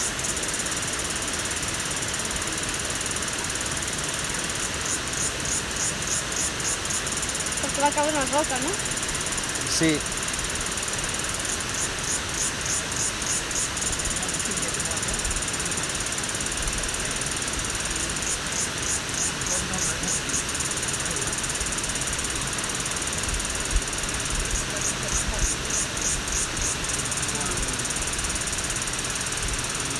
Soundscapes > Nature
Tat Kuang Si Waterfall, Laos (March 24, 2019)
Recording of the Tat Kuang Si Waterfall in Laos. Features the sound of rushing water surrounded by jungle ambiance.
waterfall, Si, Tat, jungle, Kuang, ambient, water, nature, flow, natural, Laos, tropical